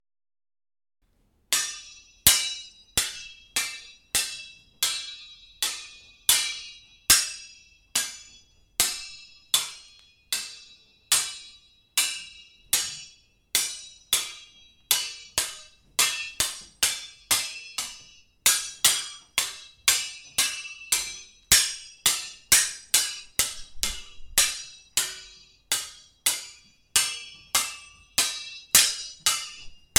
Soundscapes > Urban
Säbel Kampf / Saber fight
Säbel Kampf mit echtem Kung Fu Säbel. Saber combat with real kung fu sabers.
kungfu, sword-slash, sword, Knights